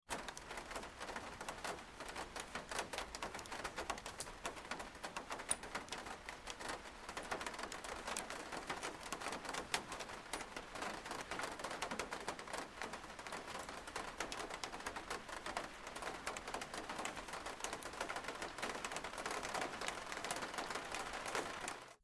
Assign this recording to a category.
Sound effects > Other